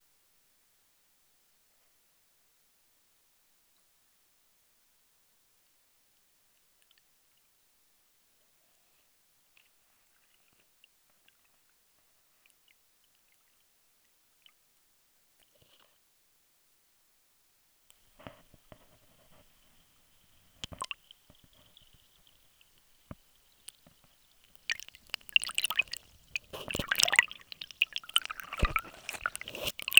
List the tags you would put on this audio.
Soundscapes > Nature
babbling flow liquid river stream water

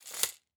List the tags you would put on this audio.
Sound effects > Other mechanisms, engines, machines
noise sample garage rustle

